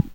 Sound effects > Experimental
Analog Bass, Sweeps, and FX-020
sample, bassy, basses, mechanical, fx, alien, retro, electro, dark, oneshot, korg, trippy, synth, robot, sci-fi, complex, pad, weird, effect, scifi, electronic, robotic, analogue, sweep, analog, machine, bass, vintage, sfx, snythesizer